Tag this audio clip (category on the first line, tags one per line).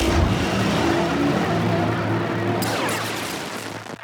Sound effects > Experimental
edm
crack
lazer
hiphop
idm
otherworldy
impacts
zap
pop
laser
experimental
sfx
perc
impact
whizz
alien
fx
glitch
percussion
abstract
clap
glitchy
snap